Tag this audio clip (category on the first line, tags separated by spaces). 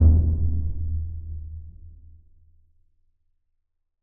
Sound effects > Electronic / Design
BOOM FUNK BOLHA BRASIL BRAZILIAN MANDELAO LOW HIT BASSY BRASILEIRO BRAZIL PROIBIDAO RUMBLING EXPLOSION DEEP RATTLING IMPACT